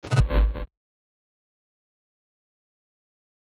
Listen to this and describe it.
Sound effects > Electronic / Design
Glitch (Faulty Core) 3
audio-glitch, audio-glitch-sound, audio-glitch-sound-effect, computer-error, computer-error-sound, computer-glitch, computer-glitch-sound, computer-glitch-sound-effect, error-fx, error-sound-effect, glitches-in-me-britches, glitch-sound, glitch-sound-effect, machine-glitch, machine-glitching, machine-glitch-sound, ui-glitch, ui-glitch-sound, ui-glitch-sound-effect